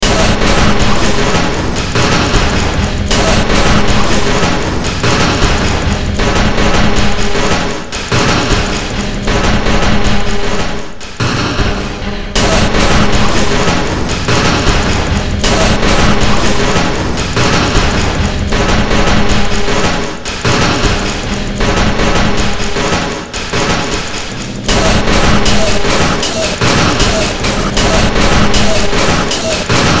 Music > Multiple instruments
Short Track #3891 (Industraumatic)
Sci-fi, Horror, Ambient, Games, Underground, Soundtrack, Industrial, Cyberpunk, Noise